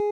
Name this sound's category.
Instrument samples > String